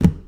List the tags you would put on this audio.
Sound effects > Objects / House appliances
metal
foley
lid
knock
pail
debris
hollow
drop
carry
slam
shake
plastic
water
cleaning
object
tool
household
kitchen
container
liquid
spill
pour
scoop
handle
fill
clatter
bucket
clang
garden
tip

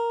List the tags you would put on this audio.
Instrument samples > String
arpeggio
cheap
design
guitar
sound
stratocaster
tone

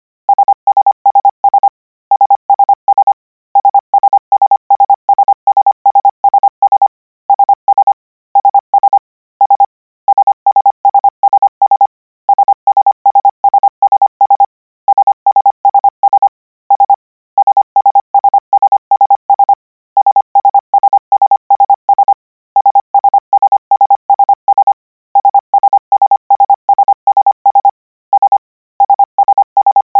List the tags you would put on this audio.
Sound effects > Electronic / Design
code
codigo
letters
morse
radio

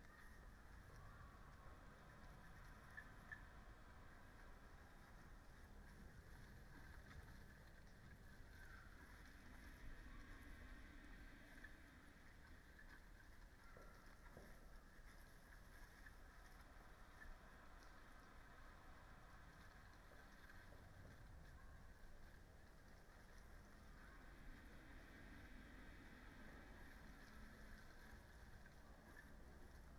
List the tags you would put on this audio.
Soundscapes > Nature
weather-data
modified-soundscape
field-recording
artistic-intervention
Dendrophone
nature
raspberry-pi
natural-soundscape
alice-holt-forest
data-to-sound
sound-installation
phenological-recording
soundscape

